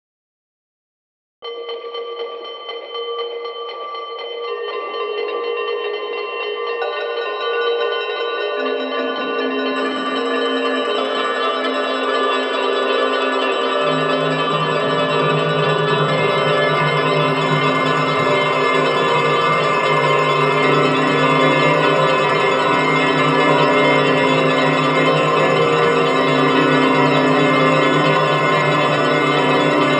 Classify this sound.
Sound effects > Other mechanisms, engines, machines